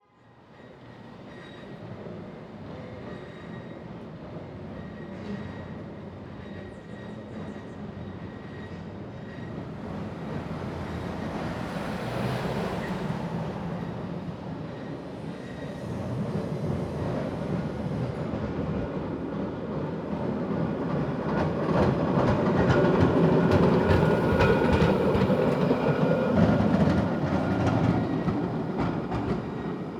Soundscapes > Urban
A cable car goes by going down a hill in San Francisco, then shortly after another one goes by going up the hill. Also some random traffic.
cable, cable-car, field-recording, outside, rail, street, train, transportation, urban